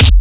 Instrument samples > Percussion
BrazilFunk Kick 16 Processed-7
Brazilian, BrazilFunk, Kick, Distorted, BrazilianFunk